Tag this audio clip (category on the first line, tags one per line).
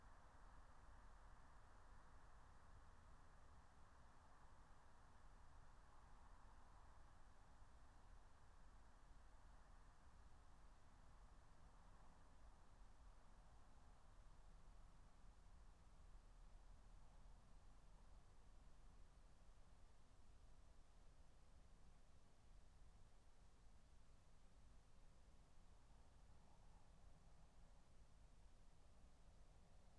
Soundscapes > Nature
soundscape
alice-holt-forest
natural-soundscape
meadow
raspberry-pi
field-recording
nature
phenological-recording